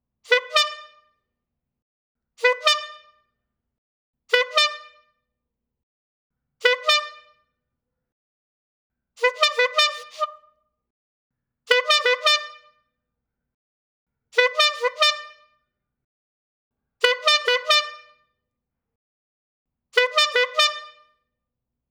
Sound effects > Objects / House appliances
Honking a bulb horn various times. It was on a bicycle but also sounds like a horn a clown might use.